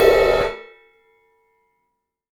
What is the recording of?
Music > Solo instrument
Sabian 15 inch Custom Crash-2
15inch, Crash, Custom, Cymbal, Cymbals, Drum, Drums, Kit, Metal, Oneshot, Perc, Percussion, Sabian